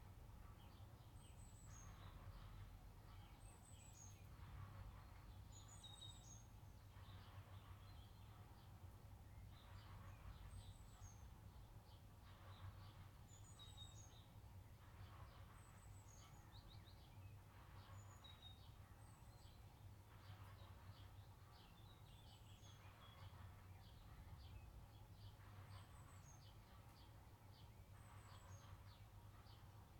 Soundscapes > Nature
24h ambiance pt-10 - 2025 04 16 18H00 - 20H37 Gergueil Greenhouse
MS, afternoon, weather, Mid-side, April, country-side, Cote-dor, windless, France, 2025, Rural, plastic, over-night, 21410, late, green-house, Night, raining, Zoom-H2N, Gergueil, rain-shower, H2N, rain, Bourgogne-Franche-Comte, field-recording